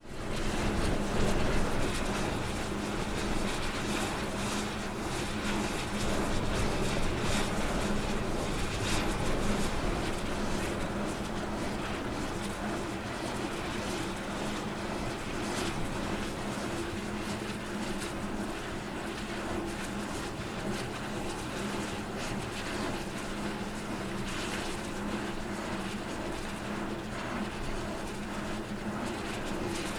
Soundscapes > Urban
NEG Micon power generating windmill turning
This is the sound produced by a NEG Micon power-generating windmill. The wind speed at the time of the recording on the Beaufort wind scale was 4Bft (moderate breeze, 20-28 km or 13-18miles) with gusts up to 6Bft (strong breeze, 38-49km or 25-31 miles). Field-recording.
energy, generating, NEG-Micon, power, renewable, source, wind, windmill